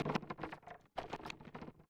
Sound effects > Objects / House appliances
WoodDoor Creak 03
Short creak sound resulting from a moving or deformation of an old wooden door.
from, sound, Short, old, creak